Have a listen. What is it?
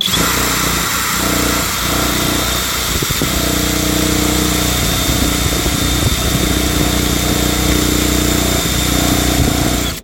Sound effects > Objects / House appliances
TOOLPowr-Samsung Galaxy Smartphone, CU Pregame Drill Driver, Start, Run, Stop Nicholas Judy TDC
A pregame drill driver starting, running and stopping. Recorded at Lowe's.
drill-driver, Phone-recording, run, start, stop